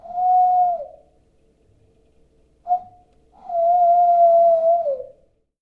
Sound effects > Animals
TOONAnml-Blue Snowball Microphone, CU Tawny Owl Hoot, Human Imitation, Cartoon Nicholas Judy TDC
A tawny owl hooting. Human imitation. Cartoon.
Blue-Snowball, cartoon, human, imitation, owl, tawny, tawny-owl